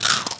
Sound effects > Human sounds and actions
The sound of biting off the first bite of a crispy toast bread. Only cleanup is fadein/out in Audacity.